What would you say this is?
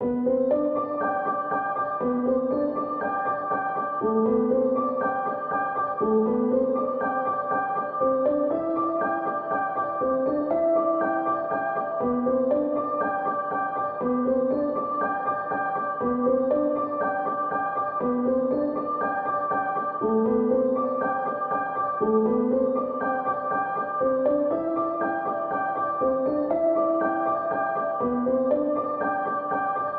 Music > Solo instrument
Piano loops 120 efect 4 octave long loop 120 bpm
120, 120bpm, free, loop, music, piano, pianomusic, reverb, samples, simple, simplesamples